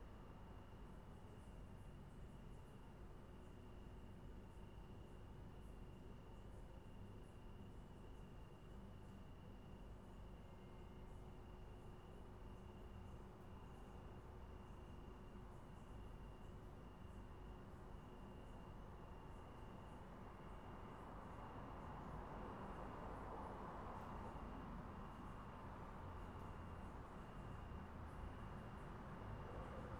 Soundscapes > Urban
Nighttime ambience with frequent passing cars in a warm Tbilisi summer setting. Clean mono urban movement with a steady traffic presence. If you’d like to support my work, you can get all my ambience recordings in one pack on a pay-what-you-want basis (starting from just $1). Your support helps me continue creating both free and commercial sound libraries! 🔹 What’s included?